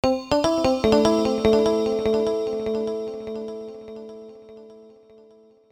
Instrument samples > Piano / Keyboard instruments
Ableton Live. VST.Purity......4 Note Free Music Slap House Dance EDM Loop Electro Clap Drums Kick Drum Snare Bass Dance Club Psytrance Drumroll Trance Sample .
Note, Snare, Bass, Drum, 4, Free, Dance, Drums, EDM, Loop, Kick, Slap, Music, Clap, Electro, House